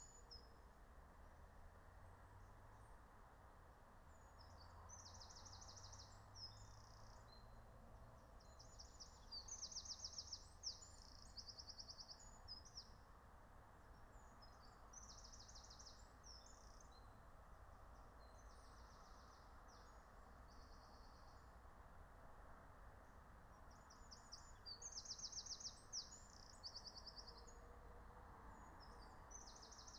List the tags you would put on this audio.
Soundscapes > Nature
soundscape
phenological-recording
natural-soundscape
nature
field-recording
meadow
raspberry-pi
alice-holt-forest

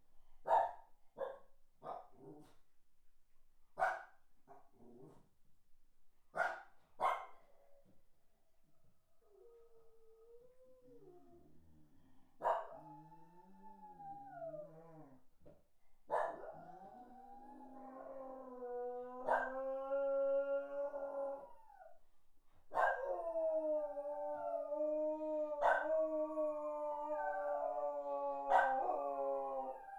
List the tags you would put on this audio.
Sound effects > Animals
home
dogs
pets
animals

